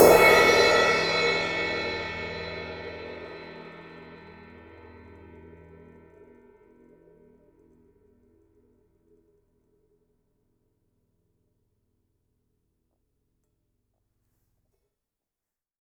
Music > Solo instrument
Sabian 15 inch Custom Crash-13
15inch, Oneshot, Cymbals, Crash, Custom, Sabian, Drum, Drums, Metal, Perc, Cymbal, Percussion, Kit